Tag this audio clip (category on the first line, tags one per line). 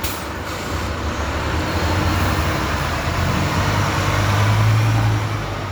Sound effects > Vehicles
vehicle
transportation
bus